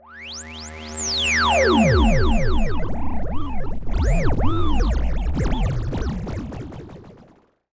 Sound effects > Experimental
Analog Bass, Sweeps, and FX-075
dark, mechanical, electronic, analogue, fx, oneshot, sweep, snythesizer, korg, weird, effect, retro, robotic, sfx, bass, analog, electro, vintage, robot, pad, trippy, scifi, bassy, sample, sci-fi, alien, basses, synth, complex, machine